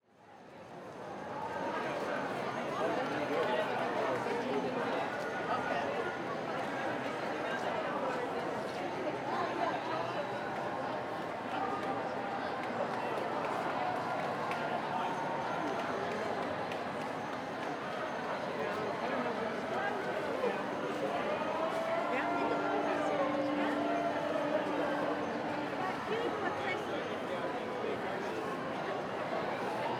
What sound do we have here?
Soundscapes > Urban
A massive crowd of people marching through Portland as part of the No Kings protests across the US in response to the abhorrent policies of the christofascist trump administration.
abolish-ice, cheer, demonstration, ambience, city, outside, noise, urban, street, people, protest, fuck-trump, crowd, field-recording, loud